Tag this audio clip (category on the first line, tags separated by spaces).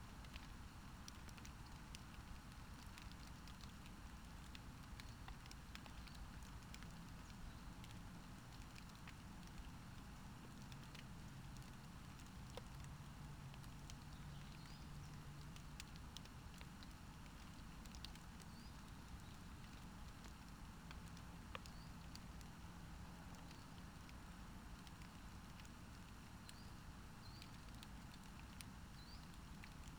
Soundscapes > Nature
alice-holt-forest natural-soundscape nature raspberry-pi soundscape